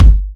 Instrument samples > Percussion
kick gritty
The attack has an extra fading mid-high sinewave. The middle of the sine is louder because the overall pitch shifting sinewave envelope oscillates at an inaudibly low frequency.
thrash-metal, drum, bass-drum, thrash, headwave, fat-kick, rhythm, mainkick, kick, attack, metal, trigger, death-metal, bassdrum, kickgrit, drums, groovy, forcekick, pop, semi-electronic, bass, fatdrum, rock, fatkick, headsound, beat, gritty-kick, gritkick, fat-drum, percussion